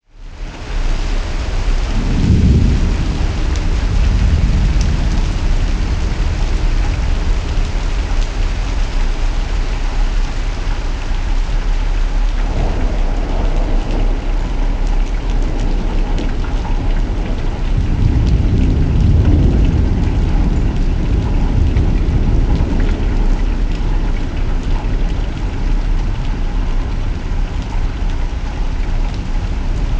Soundscapes > Nature
Experimental Thunderstorm Recording
An experimental mono recording of a thunderstorm over the city. A combination of a cardioid mic pointing out the window and a geophone attached to the inside wall of the building, resulting in a strange storm sound. Almost metallic. Equipment: Zoom F3 field recorder Sennheiser shotgun mic LOM Geofon mic